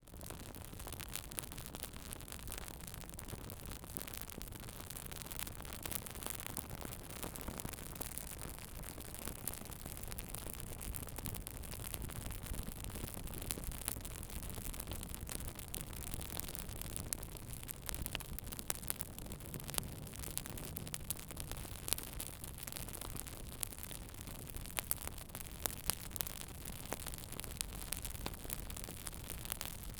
Sound effects > Other
Suburban Firepit 2025-06-14 10PM, Some Neighborhood Noise
Small fire in my backyard pit, medium sized logs, 10PM, sizzles are from juniper branches flaring. There's some random noise like cars and dogs barking in the background. Recorded with a Zoom H4n in close proximity.
H4n, outdoors, firepit, neighborhood, fire, Zoom